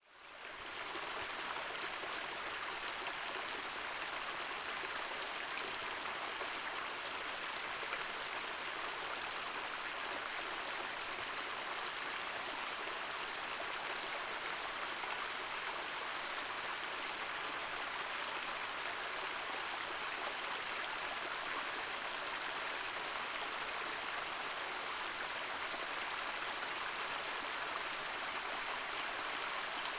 Soundscapes > Nature
Small stream close-up
Small stream flowing on the island of Ikaria, Greece. Calming and relaxing.
babbling, brook, calming, flowing, relaxing, stream